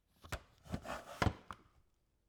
Sound effects > Objects / House appliances
250726 - Vacuum cleaner - Philips PowerPro 7000 series - head application
FR-AV2, Vacum, Powerpro, aspirateur, Hypercardioid, MKE-600, Tascam, vacuum-cleaner, Single-mic-mono, Powerpro-7000-series, Shotgun-mic, 7000, cleaner, Shotgun-microphone, MKE600, vacuum, Sennheiser